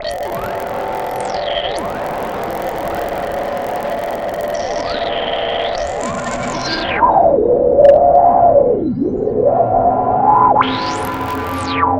Sound effects > Electronic / Design
Roil Down The Drain 10
cinematic, dark-techno, drowning, horror, science-fiction, sound-design